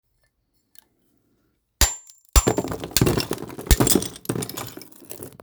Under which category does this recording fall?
Sound effects > Objects / House appliances